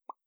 Sound effects > Human sounds and actions
A really quick pop sound effect.